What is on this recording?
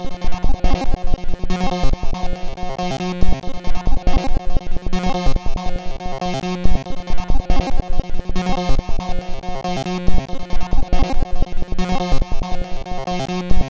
Instrument samples > Synths / Electronic
This 140bpm Synth Loop is good for composing Industrial/Electronic/Ambient songs or using as soundtrack to a sci-fi/suspense/horror indie game or short film.
Alien, Ambient, Dark, Drum, Industrial, Loop, Loopable, Packs, Samples, Soundtrack, Underground, Weird